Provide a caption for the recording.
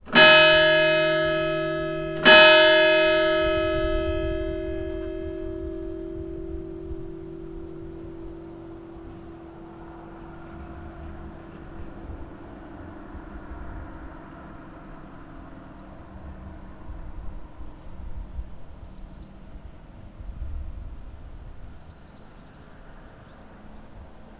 Soundscapes > Urban
Subject : Recording the bells in Quemigny 21220 from right next the down hall. Date YMD : 2025 September 09 at 14h Location : Quemigny 21220 Bourgogne-Franche-Comte Côte-d'Or France Hardware : Sennheiser MKE600 with foam windcover and a Tascam FR-AV2 Weather : Processing : Trimmed and normalised in Audacity. Notes : The bells ring on the hour, and half hour.